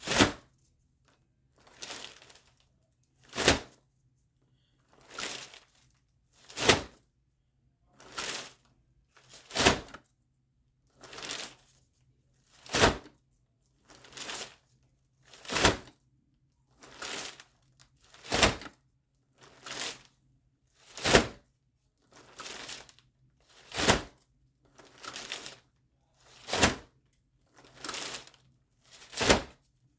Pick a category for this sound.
Sound effects > Objects / House appliances